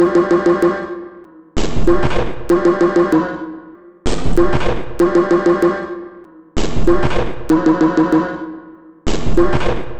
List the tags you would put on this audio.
Instrument samples > Percussion
Underground
Industrial

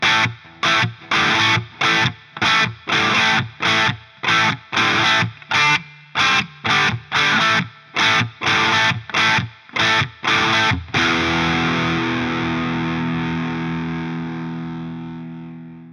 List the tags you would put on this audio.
Music > Solo instrument
electric
guitar
distorted

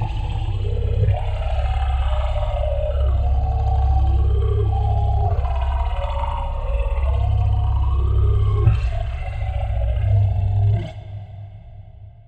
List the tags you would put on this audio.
Sound effects > Experimental
visceral
gamedesign
Ominous
Sounddesign
Fantasy
Deep
Creature
Snarling
fx
Vocal
Sound
Monstrous
scary
Frightening
Echo

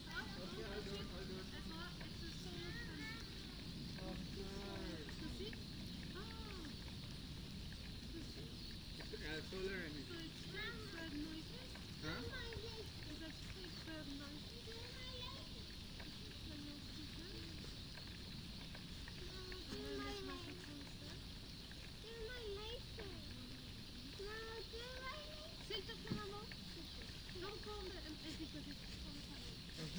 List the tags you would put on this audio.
Soundscapes > Nature
raspberry-pi
sound-installation
weather-data
Dendrophone
soundscape
data-to-sound

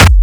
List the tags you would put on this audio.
Instrument samples > Percussion
BrazilFunk
Distorted
Kick

